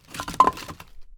Sound effects > Natural elements and explosions
Picking up a log from a pile - XY
Subject : Taking a piece of wood from a pile, logs probably falling over. Date YMD : 2025 04 22 Location : Inside a Barn Gergueil France. Hardware : Tascam FR-AV2, Rode NT5 in a XY configuration. Weather : Processing : Trimmed and Normalized in Audacity.
2025, Rode, Wood, Tasam, NT5, XY, FR-AV2, Wooden, falling, Log